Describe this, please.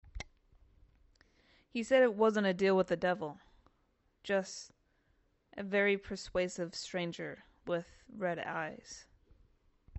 Speech > Solo speech
Sets up a classic devil’s bargain with a modern twist—perfect for urban fantasy and moral dilemmas.